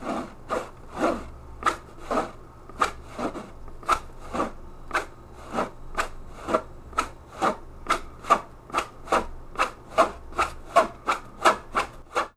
Sound effects > Objects / House appliances
Swishes. Simulated using fingernails scratching a plastic tray.